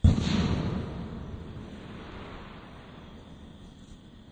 Sound effects > Natural elements and explosions
EXPLMisc-CU Explosion Nicholas Judy TDC
fireworks, explosion, slowed-down, Phone-recording, boom